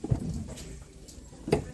Sound effects > Objects / House appliances
A piano foot pedal being pushed down and up. Recorded at Goodwill.